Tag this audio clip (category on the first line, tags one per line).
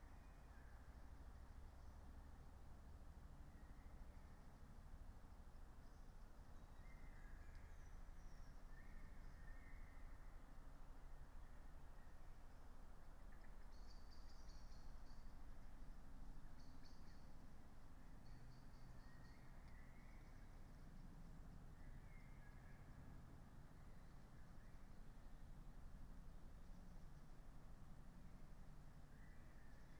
Soundscapes > Nature
Dendrophone
alice-holt-forest
nature
artistic-intervention
data-to-sound
modified-soundscape
raspberry-pi
natural-soundscape
sound-installation
soundscape
weather-data
field-recording